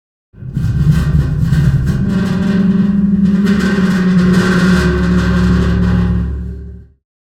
Sound effects > Other
Raw Industrial Recordings-Scratching Metal 006
Audio recorded by me. Field recording equipment: Tascam Portacapture x8 and microphone: RØDE NTG5. Raw recording file, basic editing in Reaper 7.
abstract, cinematic, distorted, drone, experimental, foley, found, mechanical, raw, scraping, scratching, sfx, sound, sounds, textures